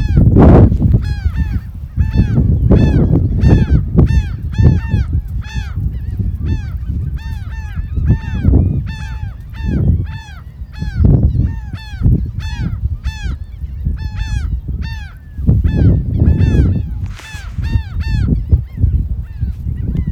Soundscapes > Nature
Sea birds and wind
Recorded on iPhone16
birdsong, shore, nature, birds, field-recording, bird, beach